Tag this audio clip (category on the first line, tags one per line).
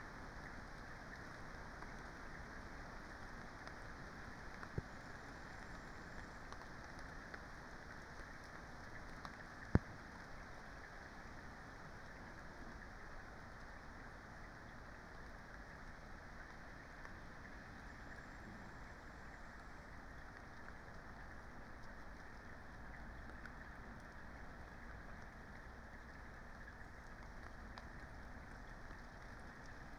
Soundscapes > Nature
nature
phenological-recording